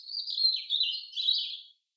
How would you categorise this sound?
Sound effects > Animals